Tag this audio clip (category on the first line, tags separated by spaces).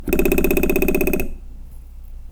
Sound effects > Objects / House appliances
Beam Clang ding Foley FX Klang Metal metallic Perc SFX ting Trippy Vibrate Vibration Wobble